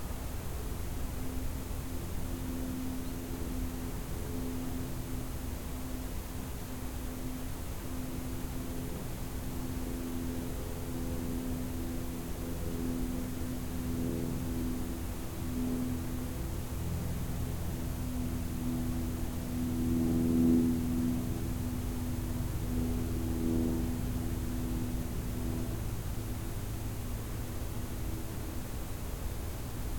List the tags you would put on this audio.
Soundscapes > Nature
XY
Gergueil
Zoom
France
field-recording
Cote-dor
Night
forrest
D104
H1n
Stag
forest
21410
country-side
2025
brame
Nature
late-evening
September
owl

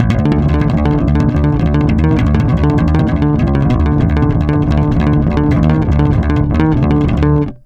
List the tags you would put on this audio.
Instrument samples > String
electric
mellow
rock
charvel
oneshots
plucked
loops
funk
pluck
slide
loop
riffs
blues
fx
bass

